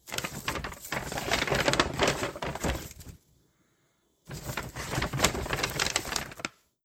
Sound effects > Objects / House appliances
OBJBag-Samsung Galaxy Smartphone, CU Paper, Fold, Unfold Nicholas Judy TDC
A paper bag folding and unfolding.
paper, bag, Phone-recording, unfold, fold, foley